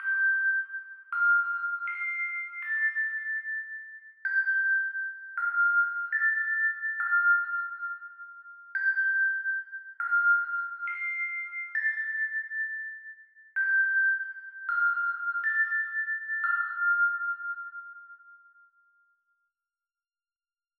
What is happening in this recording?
Music > Solo instrument
I used for a short film to evoke a melancholic memory. Keys: F Major Instrument: Bells BPM: Unknown Tempo: Free/Intuitive